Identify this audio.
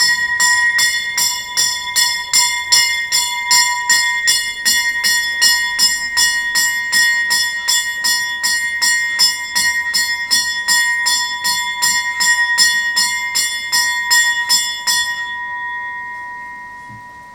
Sound effects > Vehicles
isbil02 kort
the ice cream truck
cream, ice, truck